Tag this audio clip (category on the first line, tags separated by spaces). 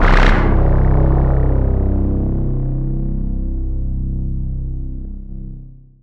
Instrument samples > Synths / Electronic
sub clear bass subwoofer synth stabs wavetable drops low synthbass subs lowend bassdrop lfo wobble subbass